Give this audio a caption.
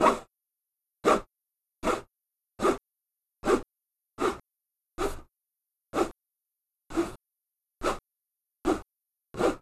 Sound effects > Objects / House appliances
SWSH-Blue Snowball Microphone, CU Swishes, Simulated Using Nails On Plastic Tray 02 Nicholas Judy TDC
Swishes. Simulated using fingernails scratching a plastic tray.